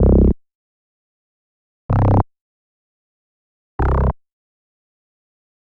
Instrument samples > Synths / Electronic
VSTi Elektrostudio (Model Mini)